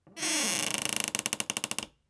Sound effects > Objects / House appliances
wooden door creak8
creaking, wooden